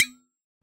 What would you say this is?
Sound effects > Objects / House appliances
Solid coffee thermos-017
recording, sampling